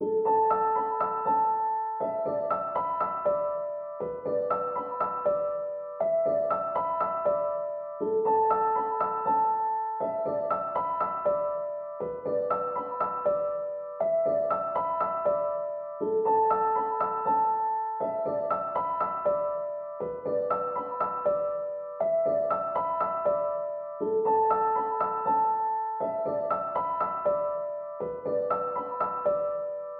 Music > Solo instrument
Piano loops 189 octave up short loop 120 bpm
simple, free, simplesamples, 120bpm, loop, samples, reverb, music, piano, 120, pianomusic